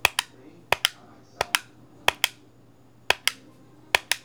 Sound effects > Other
UI Button Presses
I created this button press sound effect for menu and ui buttons for one of my upcoming games. Credit is not necessary, but always appreciated. Thanks!